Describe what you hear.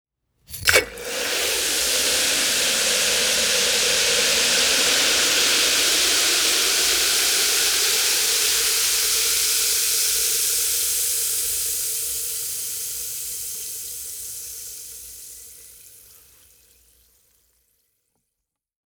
Sound effects > Natural elements and explosions
Effervescent granules poured into liquid and reacting naturally. Great for chemical reactions, liquid detail, or organic texture.